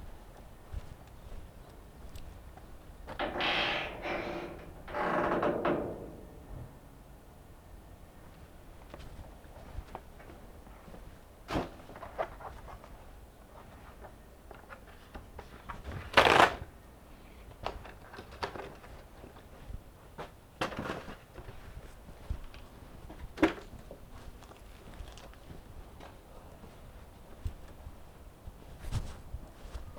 Soundscapes > Urban
Garbage Shelter Gate
Old recording, made probably with phone while taking out the garbage, in early 2015. Contains also throwing away paper and crushing plastic bottles sounds.
door, gate, squeak, squeaky